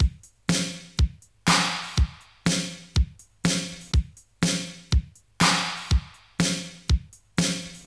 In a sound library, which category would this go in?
Music > Solo percussion